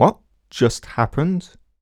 Speech > Solo speech
Surprised - What just happened
Vocal, singletake, surprised, Tascam, dialogue, Single-take, Man, voice, FR-AV2, Human, Male, NPC, Mid-20s, oneshot, Video-game, U67, Neumann, confused, talk, Voice-acting, what